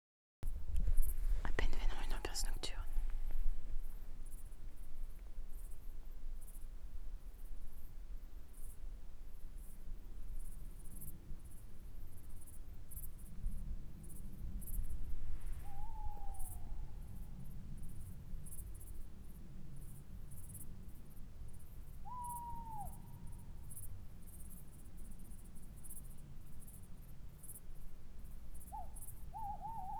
Soundscapes > Nature
AMBIANCE nocturne chouette hulotte + insectes (niveau bas) - night atmosphere with tawny owl, very low record
Une ambiance enregistrée de nuit, silence et chouette hulotte, niveau très faible (à remonter) - night atmosphere with tawny owl and insects, silence, very low record, couple ORTF